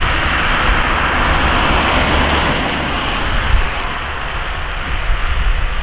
Sound effects > Vehicles
Car00058368CarSinglePassing
field-recording, automobile, rainy, drive, vehicle, car